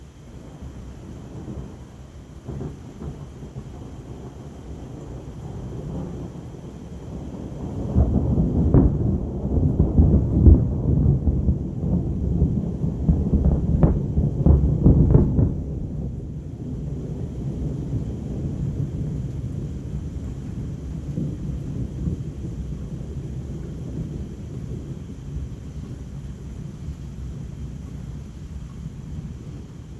Sound effects > Natural elements and explosions
THUN-Samsung Galaxy Smartphone, CU Thunder, Big, Loud, Boom, Rumble, Or Distant Cannons Nicholas Judy TDC
A big and loud thunder rumble and boom. Also sounds like cannons firing in distance.
big, fire, thunder, cannons, rumble, loud, Phone-recording